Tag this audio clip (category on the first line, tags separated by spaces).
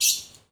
Sound effects > Animals
aggressive angry birb bird bird-chirp bird-chirping birdie call calling chirp chirping excited indonesia isolated little-bird scream short single